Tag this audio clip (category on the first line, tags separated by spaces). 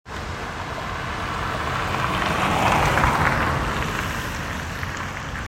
Sound effects > Vehicles
automobile car outside vehicle